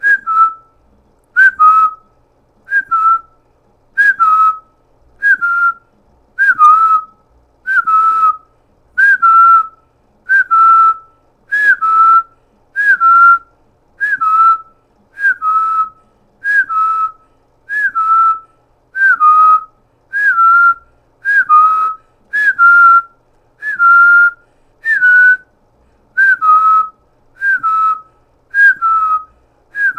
Sound effects > Objects / House appliances
TOONWhis-Blue Snowball Microphone, CU Cuckoo Whistle Nicholas Judy TDC
A cuckoo whistle.
Blue-brand, cartoon, cuckoo, whistle